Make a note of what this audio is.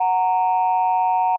Instrument samples > Synths / Electronic
Landline Phonelike Synth F#6
JI, JI-3rd, just-minor-3rd, Landline, Landline-Holding-Tone, Landline-Telephone, Synth